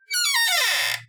Sound effects > Other mechanisms, engines, machines
Squeaky Hinge
squeaky cupboard hinge variations
Creaks, Hinge, Squeaky